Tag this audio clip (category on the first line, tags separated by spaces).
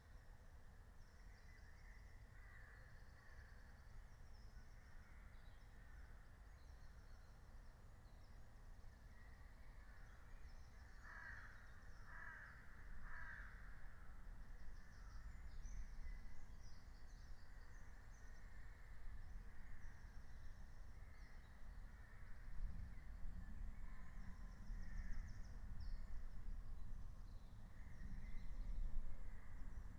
Soundscapes > Nature
field-recording natural-soundscape meadow soundscape raspberry-pi nature phenological-recording alice-holt-forest